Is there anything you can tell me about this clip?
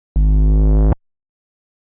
Instrument samples > Synths / Electronic
FL Grime Bass - Short
A oneshot reversed 808 for grime, trap, drill, hiphop or dubstep.
Bass, dubstep, trap, 1shot, oneshot, grimey, rap, 808, garage, hiphop, grime